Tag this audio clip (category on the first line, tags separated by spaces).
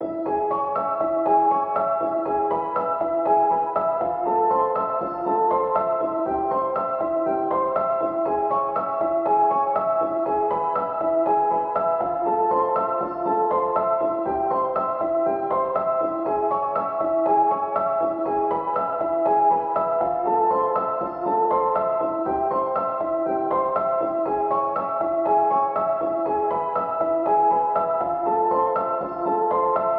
Music > Solo instrument
piano samples free simple 120 120bpm simplesamples loop reverb pianomusic